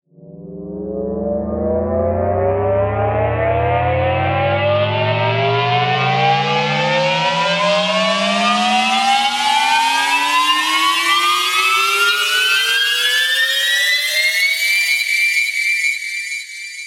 Sound effects > Electronic / Design
Machine Riser/Powerup
Made in LMMS using 3xOsc and a sh** ton of effects.
Alien, Machine, Powerup